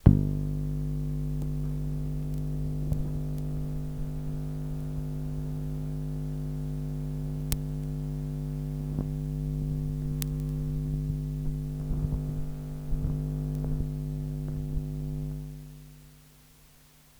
Sound effects > Objects / House appliances
Electromagnetic Field Recording of Polytone Mini-Brute Guitar Amplifier
Electromagnetic field recording of a Polytone Mini-Brute Guitar amplifier. You can clearly hear the switch turning it on at the beginning. Electromagnetic Field Capture: Electrovision Telephone Pickup Coil AR71814 Audio Recorder: Zoom H1essential
field, coil, magnetic, guitar, amplifier, field-recording, electrical, noise